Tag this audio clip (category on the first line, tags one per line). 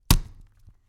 Sound effects > Experimental
foley
thud
onion
vegetable
punch
bones